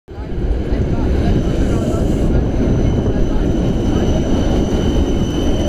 Sound effects > Vehicles
Outdoor recording of a tram at the Helsinki Päärautatieasema tram stop. Captured with a OnePlus 8 Pro using the built‑in microphone.
vehicle, tram, rail